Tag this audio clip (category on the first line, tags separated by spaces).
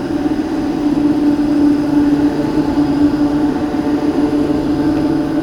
Sound effects > Vehicles
rails tram vehicle